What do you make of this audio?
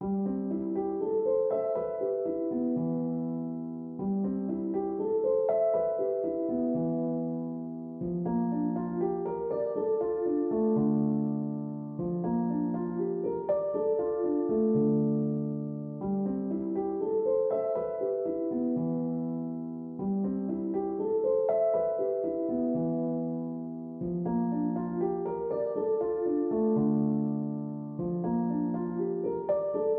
Music > Solo instrument
Piano loops 195 octave down short loop 120 bpm
120, 120bpm, free, loop, music, piano, pianomusic, reverb, samples, simple, simplesamples